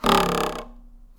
Sound effects > Other mechanisms, engines, machines
Handsaw Beam Plank Vibration Metal Foley 10
fx, handsaw, metal, percussion, plank, saw, sfx, smack, twangy, vibration